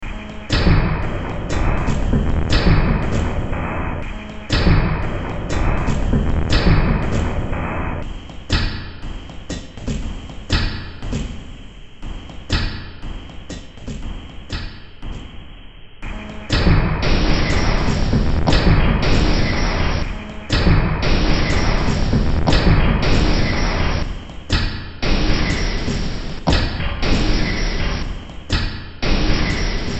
Music > Multiple instruments
Short Track #2941 (Industraumatic)
Ambient, Cyberpunk, Games, Industrial